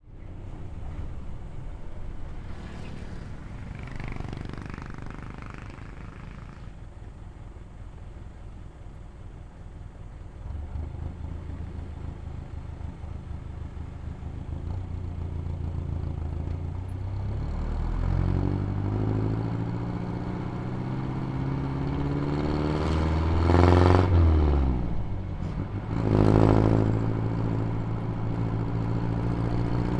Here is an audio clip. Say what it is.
Sound effects > Vehicles
250802 085228 PH Jeppney sounds
Jeepney sounds. (take 2) I made this recording sitting as near as possible of the rear of a jeepney, pointing the recorder to the exhaust system of the vehicle, while we were travelling through the hills of the province of Batangas, in the Philippines. As you can guess, one can hear the sounds of the jeepney (engine and bumps), as well as those from the surroundings, and slightly, from time to time, voices of the passengers (adults and kids) travelling in the vehicle. Recorded in August 2025 with a Zoom H5studio (built-in XY microphones). Fade in/out applied in Audacity.